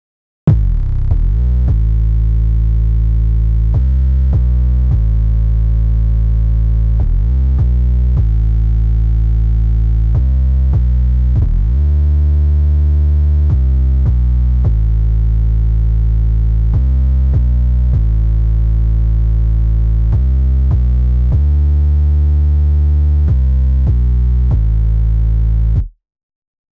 Music > Solo instrument
A small melody with deep 808 bass